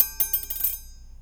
Sound effects > Objects / House appliances
Metal Tink Oneshots Knife Utensil 5
Foley, FX, Metal, metallic, ting, Vibration, Wobble